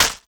Sound effects > Electronic / Design
Matchstick being struck against a matchbox or rough surface unsuccessfully. Variation 3 of 4.